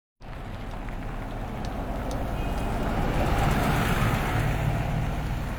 Sound effects > Vehicles
A bus passes by
bus-stop, bus, Passing